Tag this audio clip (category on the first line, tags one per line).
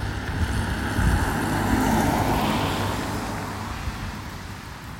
Sound effects > Vehicles
auto
car
city
field-recording
street
traffic